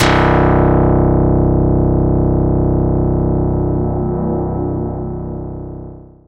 Instrument samples > Synths / Electronic
CVLT BASS 152
bass, bassdrop, drops, lfo, stabs, sub, subbass, subs, subwoofer, synth, synthbass, wavetable